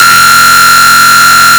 Instrument samples > Percussion
Synthed with phaseplant only. Processed with Khs Distortion, ZL EQ, Waveshaper, Fruity Limiter.
Color, ColorKick, Colorstyle, Hardstyle, Rawstyle, Zaag, ZaagKick